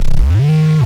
Instrument samples > Synths / Electronic
CVLT BASS 172
sub, clear